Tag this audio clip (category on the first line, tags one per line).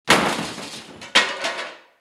Sound effects > Other
crash
glass
smash